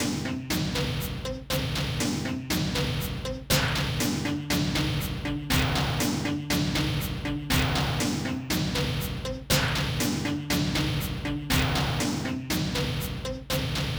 Music > Multiple instruments
A short industrial loop heavy drums and crunchy synth.
industrial, horror